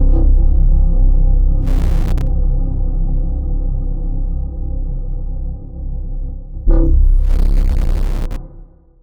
Instrument samples > Synths / Electronic
CVLT BASS 90
subbass, wobble, wavetable, clear, low, drops, synth, stabs, bass, synthbass, bassdrop, lfo, subwoofer, lowend, sub, subs